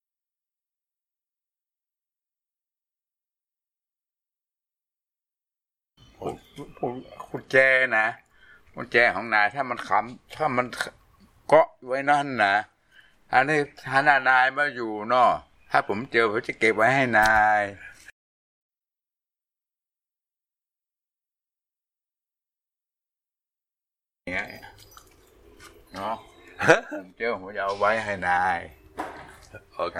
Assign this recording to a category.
Speech > Solo speech